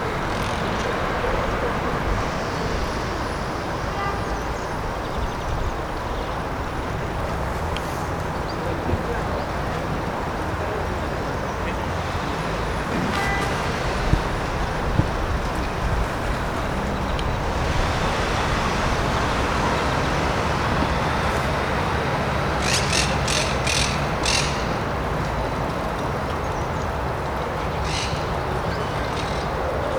Soundscapes > Urban
20250312 TheUpperPartJardinsMontbau Humans Natura Bird Nice
Bird, Humans, Jardins, Montbau, Nature, Nice, Part, The, Upper